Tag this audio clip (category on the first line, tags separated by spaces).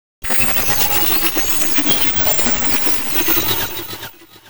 Sound effects > Electronic / Design
commons creative free industrial-noise noise rhythm royalty sci-fi scifi sound-design